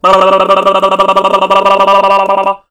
Sound effects > Human sounds and actions
TOONVox-Blue Snowball Microphone, CU Vocal Head Shake, Blubbering Nicholas Judy TDC
A blubbering, vocal head shake.
Blue-brand, Blue-Snowball, head-shake, cartoon, blubber, shake, head, vocal